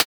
Instrument samples > Synths / Electronic
An IDM topper style percussion hit made in Surge XT, using FM synthesis.
fm, surge, electronic, synthetic